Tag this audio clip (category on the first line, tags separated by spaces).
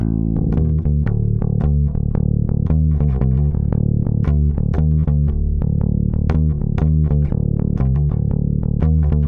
Music > Solo instrument
bass bassline basslines blues chords chuny electric electricbass funk fuzz harmonic harmonics low lowend note notes pick pluck riff riffs rock slap slide slides